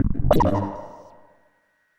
Instrument samples > Synths / Electronic
Benjolon 1 shot31
1SHOT, CHIRP, DRUM, MODULAR, NOISE, SYNTH